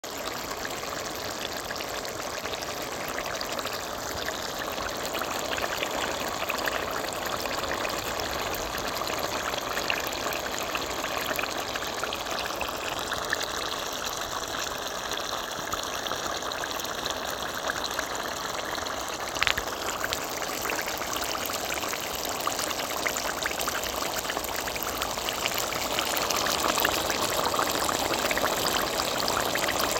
Soundscapes > Nature
Ground beef cooking? Or babbling brook?
Cooking ground beef in a soup pot outside in Nova Scotia. There was a lot of liquid coming out of the ground beef. So it sounds like a babbling brook. Or a cauldron. Or probably a lot of other things. This is my own sound that I recorded. I used my cell phone to record it... which I know isn't very fancy but, using my zoom microphone kept picking up the wind (I was cooking outside.)
babbling, beef, brook, cauldron, cooking, flowing, gurgle, magic, stream, water, witch